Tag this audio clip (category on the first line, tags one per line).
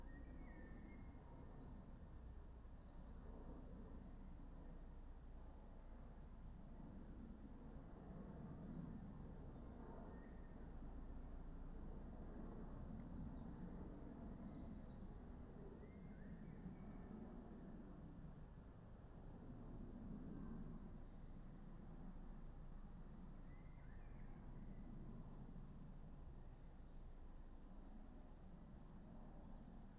Soundscapes > Nature
data-to-sound
natural-soundscape
phenological-recording
soundscape